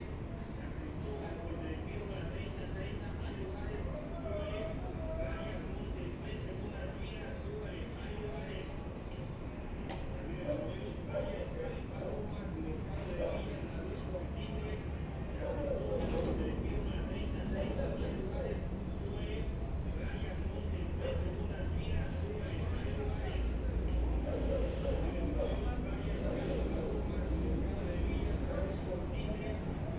Soundscapes > Urban
Ambience Mexico City Indios verdes paradero night Take 2
Ambience in Mexico City at Indios verdes subway station 11 pm.
Ambience, Mexico, Anuncio, Metro, df, CDMX, paradero, verdes, indios, noche, night, Perifoneo, Mexico-City